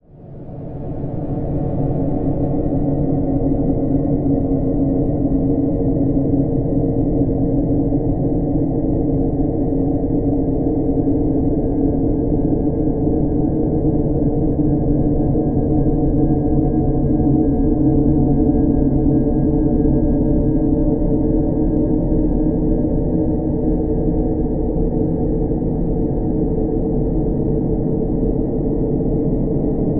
Soundscapes > Other
Spooky Horror Ambiance
suspense, background-sound, dramatic, Horror, anxious, background